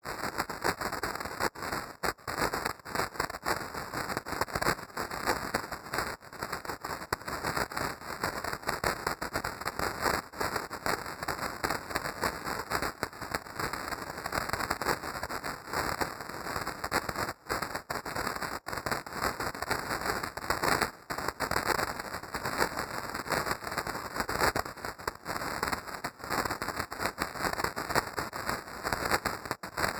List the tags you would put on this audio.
Sound effects > Objects / House appliances
TV
Error
Radio
Noise

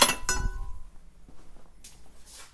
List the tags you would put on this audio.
Sound effects > Other mechanisms, engines, machines
bam
bang
boom
bop
crackle
foley
fx
knock
little
metal
oneshot
perc
percussion
pop
rustle
sfx
shop
sound
strike
thud
tink
tools
wood

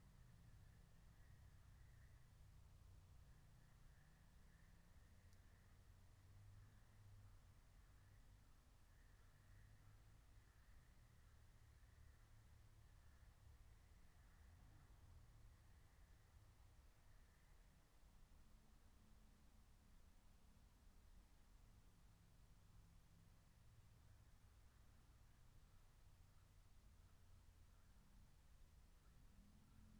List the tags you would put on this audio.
Soundscapes > Nature
field-recording
soundscape
phenological-recording
alice-holt-forest
natural-soundscape
meadow
nature
raspberry-pi

